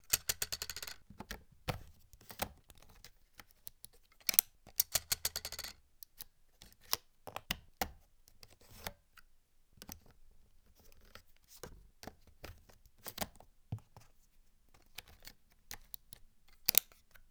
Sound effects > Objects / House appliances
Camera SD Card and Battery Inserting and Removing
The sounds of an SD Card and Battery being inserted to and removed from an EOS R10 camera. Recorded with a 1st Generation DJI Mic and Processed with ocenAudio
dslr, photography, mirrorless, video, sd, battery, canon, card, camera, photo, click